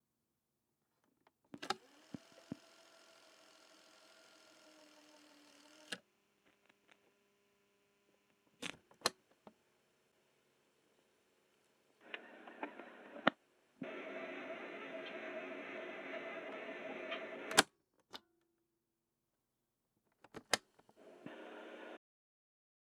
Sound effects > Objects / House appliances
Cassette Tape Player Sounds
Realistic CTR-70 tape recorder/player, recorded pressing various buttons and using various functions through a Shure sm7b going into an audient evo 4. minor compression and EQ was applied.